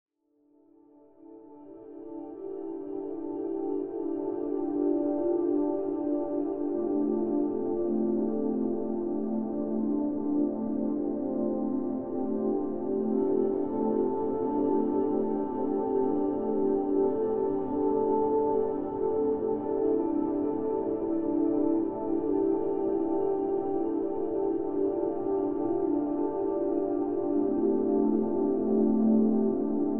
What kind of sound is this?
Soundscapes > Synthetic / Artificial
Calm ambient
was made for fun, can be used for games. made in fl studio 2024
drone, background, soundscape, ambiance, organ, ambient, harmonic, atmos, ambience, atmosphere